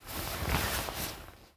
Sound effects > Objects / House appliances
cloth-foley-2
rustle, jacket, cloth, clothes, fabric, foley, movement, clothing